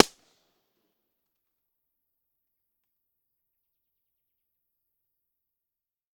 Soundscapes > Other
I&R Albi Pratgraussal North of lake - NT5o (birds flying away)
Subject : Impulse response for convolution reverb. Date YMD : 2025 August 11 Early morning. Location : Albi 81000 Tarn Occitanie France. Mostly no wind (Said 10km/h, but places I have been were shielded) Processing : Trimmed and normalised in Audacity. Very probably trim in, maybe some trim out.
Balloon, convolution, convolution-reverb, FR-AV2, impulse, Impulse-and-response, impulse-response, IR, lake, NT5-o, NT5o, outdoor, park, pop, Rode, Tascam